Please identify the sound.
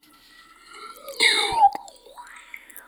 Sound effects > Objects / House appliances
weird blow and whistle mouth foley-010
bonk, clunk, drill, fieldrecording, foley, foundobject, fx, glass, hit, industrial, mechanical, metal, natural, object, oneshot, perc, percussion, sfx, stab